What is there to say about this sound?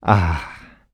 Speech > Solo speech
Relief - Ahhh
Human, U67, Mid-20s, NPC, Neumann, Man, oneshot, Ahh, dialogue, Voice-acting, FR-AV2, Male, Vocal, Ah, talk, relief, Releif, singletake, Tascam, Video-game, Exhale, Single-take, voice